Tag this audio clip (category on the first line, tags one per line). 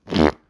Sound effects > Other
flatulence
gas